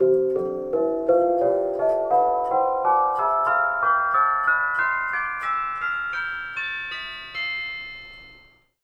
Music > Solo percussion
MUSCBell-Blue Snowball Microphone, CU Tubular, Up The Scale Nicholas Judy TDC
A tubular bell up the scale. Recorded using a Casio keyboard.